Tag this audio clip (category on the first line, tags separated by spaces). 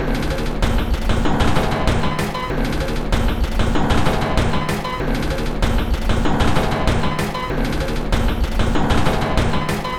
Instrument samples > Percussion
Loop Industrial Alien Weird Packs Ambient Dark Samples